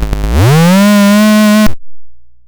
Sound effects > Electronic / Design
Optical Theremin 6 Osc dry-012
Noise, Theremin, Scifi, Otherworldly, Glitchy, Dub, Trippy, Bass, noisey, DIY, Robot, Glitch, Analog, Experimental, Digital, Alien, Electronic, Instrument, Sweep, Robotic, Synth, FX, Spacey, Infiltrator, Electro, SFX, Sci-fi, Handmadeelectronic, Optical, Theremins